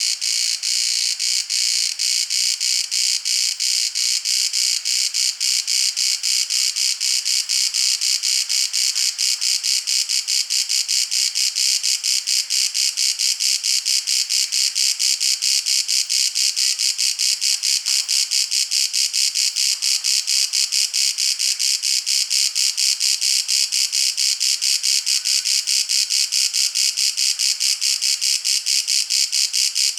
Soundscapes > Nature
Crickets singing in Venice Lido
Crickets singing recorded at Venice lido in the late summer afternoon Recorded with Tascam Portacapture X6
insects, crickets, evening, summer, field-recording, Cricket, nature